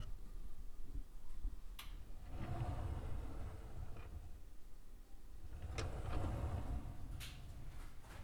Sound effects > Objects / House appliances
Rolling Drawer 08
drawer, open